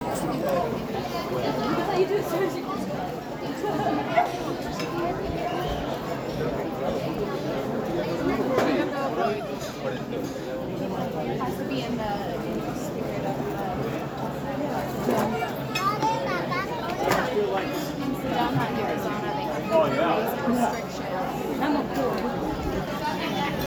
Soundscapes > Urban
Salamanca 26 May 2024 ext cafes and streets 01
People dining and talking in outdoor cafes in Salamanca, Spain in 2024. Recording device: Samsung smartphone.
outdoor-cafe, Spain, diners, Salamanca